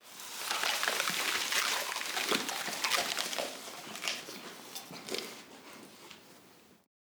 Sound effects > Human sounds and actions
30 people standing around a microphone eating an apple